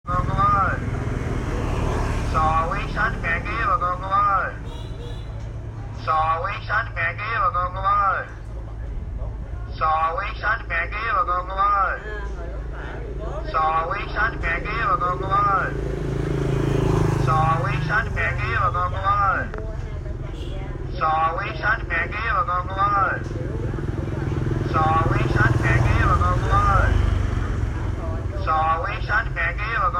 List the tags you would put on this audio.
Speech > Solo speech
sell male man viet clam business voice

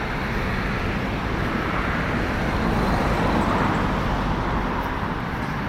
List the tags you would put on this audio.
Sound effects > Vehicles
Finland Field-recording Car

